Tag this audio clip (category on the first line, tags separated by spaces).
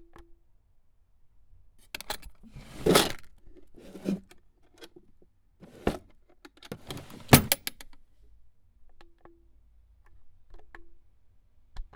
Sound effects > Objects / House appliances
dresser drawer open